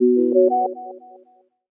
Sound effects > Electronic / Design
A quick lil arpeggio up, with short added delay. Made with a Korg Microkorg S, processed in Pro Tools.